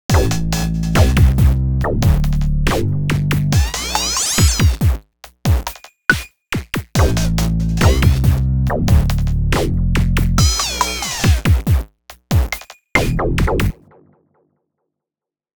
Music > Multiple instruments
hip hop beat loop melody with bass
bass, beat, chill, dark, downtempo, hip, hiphop, hop, loop, melodic, melody, percussion